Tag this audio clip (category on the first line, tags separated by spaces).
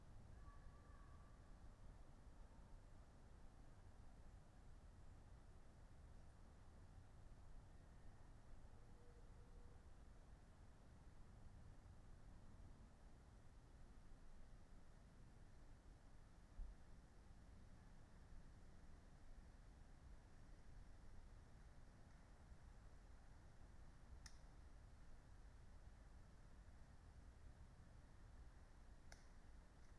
Soundscapes > Nature
alice-holt-forest field-recording nature raspberry-pi soundscape weather-data